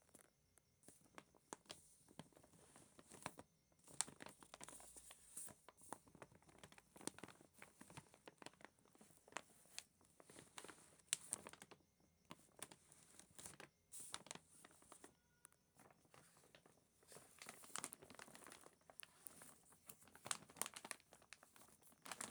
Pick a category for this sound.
Sound effects > Experimental